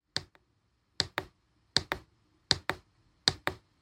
Sound effects > Other
Click sound effect 1
This sound is completely free and you can use it in any way you like.